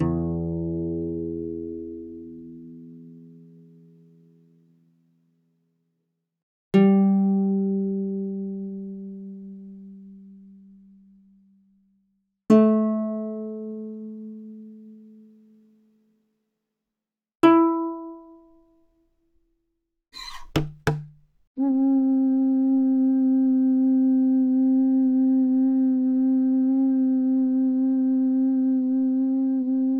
Instrument samples > Other
instrument sounds
A few instrument samples I have recorded and processed a little bit (denoising, EQ, pitch tuning, ...), including a guitar, ocarina, thumb piano, melodica and voice.
guitar, male, vocal